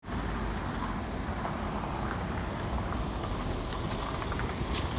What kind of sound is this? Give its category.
Sound effects > Vehicles